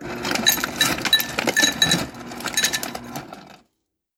Sound effects > Objects / House appliances
FOLYProp-Samsung Galaxy Smartphone, CU Refrigerator, Ice Machine, Dispensing Ice Into Glass Cup Nicholas Judy TDC
A refrigerator ice machine dispensing ice cubes into a glass cup.
dispense, refrigerator, ice-cubes, cup, fridge, ice, Phone-recording, glass, ice-cube, machine